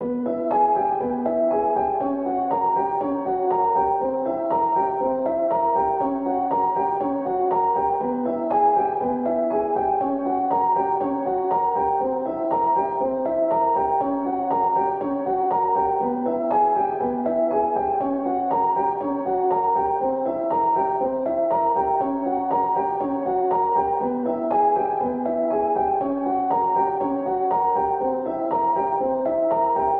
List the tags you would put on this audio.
Music > Solo instrument
120 samples simplesamples

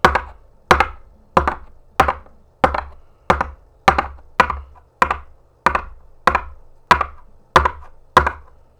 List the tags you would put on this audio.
Sound effects > Human sounds and actions
Blue-brand Blue-Snowball foley footsteps simulation wood

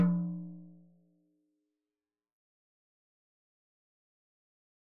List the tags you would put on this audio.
Music > Solo percussion
acoustic beat beatloop beats drum drumkit drums fill flam hi-tom hitom instrument kit oneshot perc percs percussion rim rimshot roll studio tom tomdrum toms velocity

